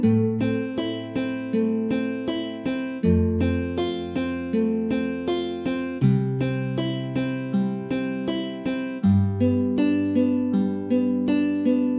Music > Solo instrument
Sad Chords Am-F-C-G @ 80bpm
80bpm, acoustic, Chord, ChordPlayer, guitar, music, OneMotion, Progression, sad